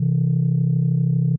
Instrument samples > Synths / Electronic
Landline Phonelike Synth C4
Holding-Tone, JI, JI-3rd, JI-Third, just-minor-3rd, just-minor-third, Landline, Landline-Holding-Tone, Landline-Phone, Landline-Phonelike-Synth, Landline-Telephone, Landline-Telephone-like-Sound, Old-School-Telephone, Synth, Tone-Plus-386c